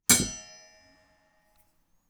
Sound effects > Other mechanisms, engines, machines
Woodshop Foley-107
tools, bop, thud, sfx, shop, boom, little, wood, sound, pop, bam, foley, perc, strike, metal, oneshot, crackle, knock, tink, fx, percussion, bang, rustle